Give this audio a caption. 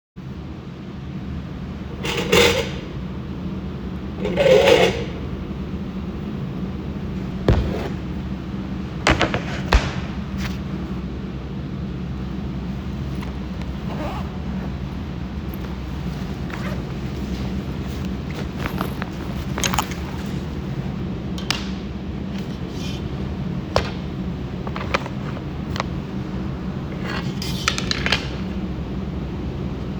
Soundscapes > Indoors
The sound of a drawing studio.